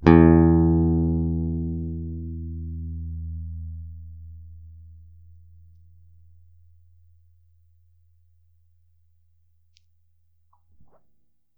Instrument samples > String
E2 - Bass Guitar Picked
E2 note picked on a Squire Strat converted Bass. Static reduced with Audacity.
bass, bass-guitar, E, E2, picked